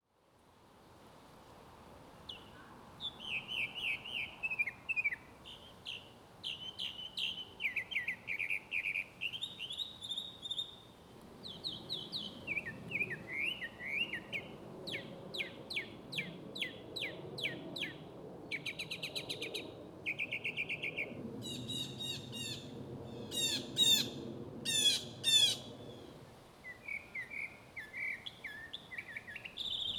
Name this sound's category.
Soundscapes > Nature